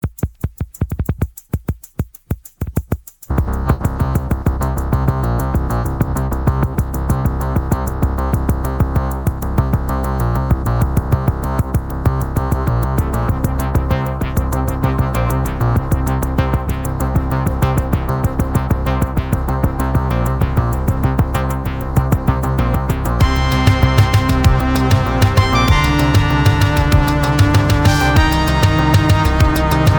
Music > Multiple instruments
Battle music
just music for my personal game, ai generated by Udio Beta (v1.5 allegro), prompted "futuristic music for a fighting game, use synthesizers and drum kits"
synth, ai-generated, futuristic, drumkit, game